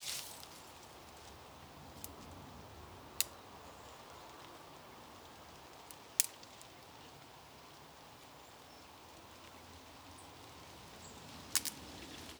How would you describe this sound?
Soundscapes > Nature
Sticks snapping in nature
Sticks snapping in a forest with trees rustling and birds chirping. Recorded with a Rode NTG-3.